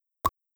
Sound effects > Objects / House appliances
My original old recording for pretty popular so i have made x3 more different versions of opening a magic potion/exilir for an RPG game. See description below a wrote for my orignal recording for what you could do when combining sounds with it... A sound effect of opening a magic potion. The character pops of the the cork lid and then once you add your following sound effects drinking the exilir. Also some bubbling sounds could be cool as well as a magical sound effect added as well. It would be great to be used in an rpg game followed by a drinking/gulping sound then a satisfied arrrgghh! Thats just how i picture it like the good ol' rpgs they used to make. Could be used for anything elese you can think of as well. It only has been edited to remove background noise of either side of clip and also volume was amplified by 5db overall due to a quiet recording. Helps to say if you need to quit down or rise volume you know where the baseline is.